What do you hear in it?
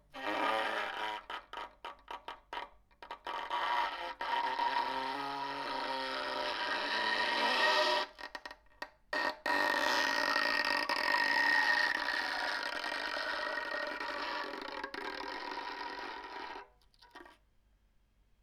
Instrument samples > String
Bowing broken violin string 14
beatup, bow, broken, creepy, horror, strings, uncomfortable, unsettling, violin